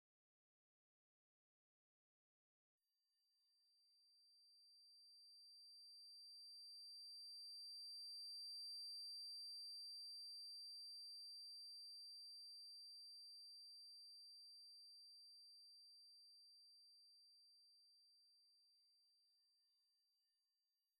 Sound effects > Electronic / Design
A high-pitched squeaking sound resembling tinnitus effect, created using a synthesizer.
atmospheric, highfrequency, highpitch, ingingsound, Long